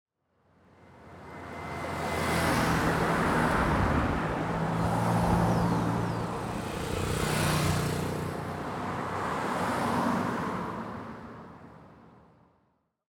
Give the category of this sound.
Sound effects > Vehicles